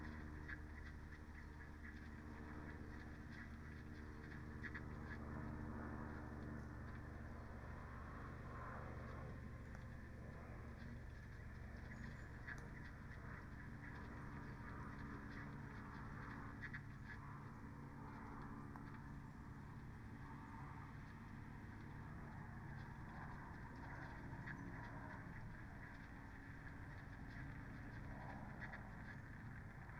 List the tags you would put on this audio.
Soundscapes > Nature
nature phenological-recording raspberry-pi Dendrophone soundscape alice-holt-forest data-to-sound modified-soundscape sound-installation artistic-intervention weather-data natural-soundscape field-recording

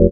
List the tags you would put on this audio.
Instrument samples > Synths / Electronic
bass fm-synthesis additive-synthesis

